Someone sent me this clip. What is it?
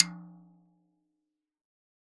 Music > Solo percussion
Hi Tom- Oneshots - 5- 10 inch by 8 inch Sonor Force 3007 Maple Rack
drums, kit, oneshot, tomdrum, instrument, hitom, drumkit, drum, percussion, flam, fill, tom, studio, beat, perc, hi-tom, toms, rim, beatloop, roll, acoustic, beats, velocity, percs, rimshot